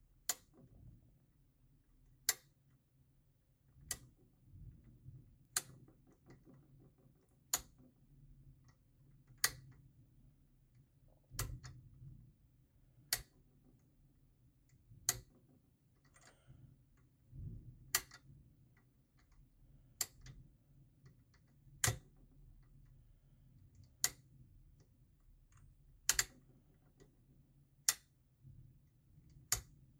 Sound effects > Other mechanisms, engines, machines
MECHSwtch-Samsung Galaxy Smartphone Lamp Switch, Click On, Off Nicholas Judy TDC
A lamp switch clicking on and off.
switch, Phone-recording, lamp, click, off, foley